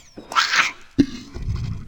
Sound effects > Experimental
Creature Monster Alien Vocal FX (part 2)-053
otherworldly, grotesque, Sfx, fx, Alien, zombie, growl, weird, bite, howl, gross, devil, Monster, snarl, dripping, demon, Creature, mouth